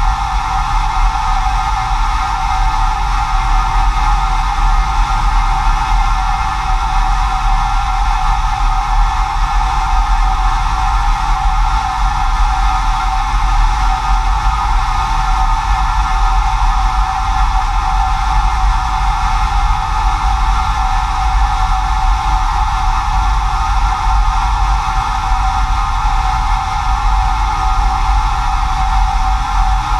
Sound effects > Experimental
"The SOS message contains a plea from a vessel 43 quadrants ahead." For this sound I recorded ambient noises in different rooms of my home with a Zoom H4n multitrack recorder. I then used Audacity to manipulate those audio files into this final piece, which is available to the world for use.
anxiety, attack, audacity, immense, panic, rapid, zoom-h4n